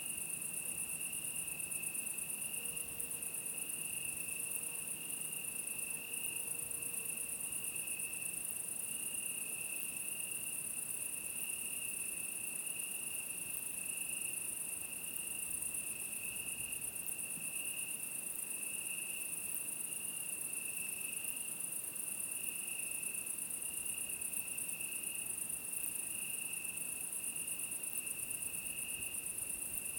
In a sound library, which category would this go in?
Soundscapes > Nature